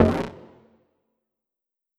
Sound effects > Electronic / Design
LoFi Grunt-02
Lofi, sudden and short grunt. Retro-esque sound emulation using wavetables.
retro, belch, grunt, animal, synth, lofi, monster